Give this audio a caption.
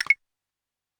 Instrument samples > Percussion
Klick - Generic Notification Sound Effect for Incoming Message etc.
Generic notification sound effect I made from percussion samples of a Yamaha DOM-30 in order to simulate incoming messages. But can of course be used for any kind of percussive effect.
Clave, Email, Fx, Message, Notification, Percussion, Sound, Tone